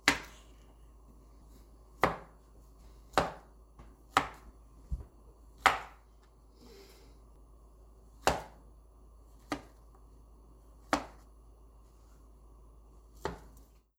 Sound effects > Objects / House appliances
FOODIngr-Samsung Galaxy Smartphone, CU Cutting Bananas Nicholas Judy TDC
Someone cutting bananas.
banana, cut, foley, Phone-recording